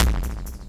Instrument samples > Synths / Electronic
CR5000BasaDrumDist 01
A handful of samples and drum loops made with Roland CR5000 drum machine. Check the whole pack for more